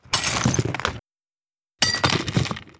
Sound effects > Objects / House appliances
Crunchy Glass Hit
Glass being smashed. Recording of a glass bottle being smashed by an aluminum baseball bat. Original recording